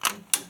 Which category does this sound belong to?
Sound effects > Other mechanisms, engines, machines